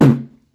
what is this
Sound effects > Human sounds and actions
HMNSpit Hollow Nicholas Judy TDC
A hollow spit.